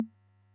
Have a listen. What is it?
Instrument samples > Percussion
tom 2 casiotone
Sampleando mi casiotone mt60 con sus sonidos de percusión por separado Sampling my casiotone mt60 percusion set direct line
sample, percusion, casiotone